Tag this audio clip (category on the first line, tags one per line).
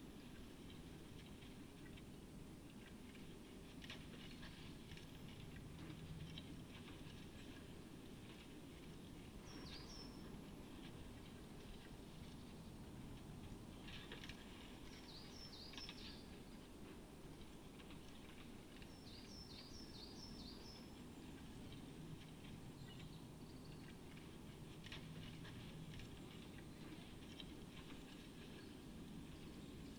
Soundscapes > Nature
Dendrophone
raspberry-pi
weather-data
data-to-sound
phenological-recording
sound-installation
natural-soundscape
artistic-intervention
alice-holt-forest
nature
modified-soundscape
field-recording
soundscape